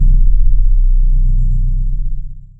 Instrument samples > Synths / Electronic
CVLT BASS 54
bass, bassdrop, clear, drops, lfo, low, lowend, stabs, sub, subbass, subs, subwoofer, synth, synthbass, wavetable, wobble